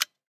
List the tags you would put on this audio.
Sound effects > Human sounds and actions
interface toggle button activation switch click off

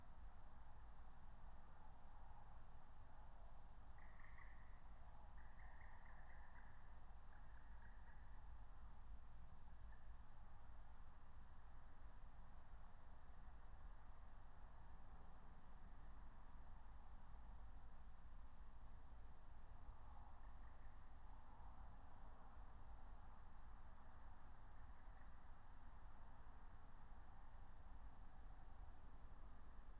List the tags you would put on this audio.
Soundscapes > Nature
nature soundscape

